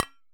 Sound effects > Objects / House appliances
Solid coffee thermos-003
recording, sampling, percusive